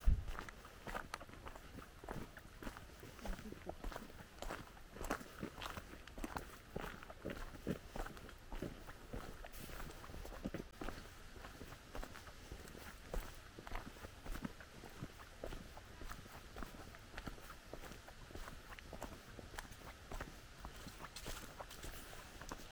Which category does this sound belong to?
Soundscapes > Nature